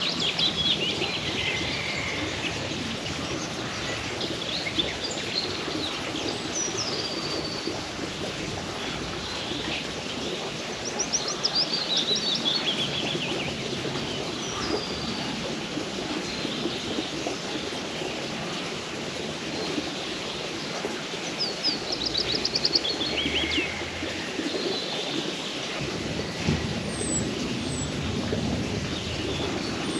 Soundscapes > Nature
🌿 I Want Spring Again – Spring Garden Soundscape 🌿
This beautiful field recording captures the authentic essence of a flourishing garden in full bloom. Close your eyes and listen to the harmonious symphony of chirping birds, buzzing insects, and the gentle natural ambience of a thriving garden – pure nature at its finest.
SOUNDSCAPE, INSECTS, WIND, GARDEN, FIELD-RECORDING, NOISE, BIRDS